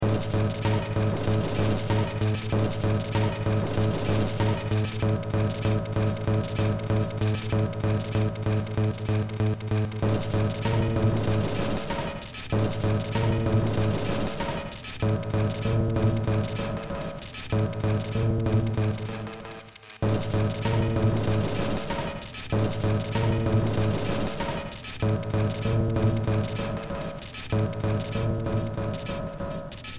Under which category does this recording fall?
Music > Multiple instruments